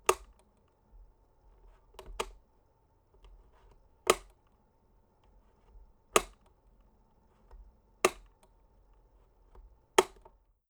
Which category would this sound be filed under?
Sound effects > Objects / House appliances